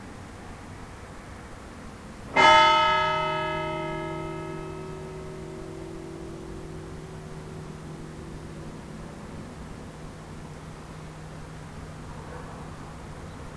Soundscapes > Urban
2025 09 09 14h30 Quemigny T junction - Church bells mic at bench facing away to the SW
Subject : Recording the bells in Quemigny 21220 from the bench under the big tree on the T junction with a Zoom H2n in MS150° mode facing SW (away from the church.) Date YMD : 2025 September 09 at 14h30 Location : Quemigny 21220 Bourgogne-Franche-Comte Côte-d'Or France GPS = 47,229516 4,864703 Hardware : Zoom H2n on a magic arm, with folded socks on used as a windcover. Weather : Mostly cloudy with pockets of light, a bit of wind. Processing : Trimmed and normalised in Audacity. Notes : The bells ring on the hour, and half hour. Leaving the marker on the church as the bench/tree is a bit convoluted with other recordings and given it's a rec of the church bell sounds appropriate.
2025, 21220, bell, Bourgogne, church, France, FR-AV2, H2n, MS, MS150, Quemigny, ringing, rural, single-hit, Tascam, village, Zoom